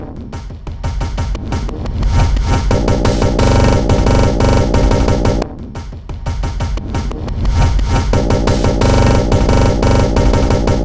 Instrument samples > Percussion
This 177bpm Drum Loop is good for composing Industrial/Electronic/Ambient songs or using as soundtrack to a sci-fi/suspense/horror indie game or short film.
Alien, Ambient, Dark, Drum, Industrial, Loop, Loopable, Packs, Samples, Soundtrack, Underground, Weird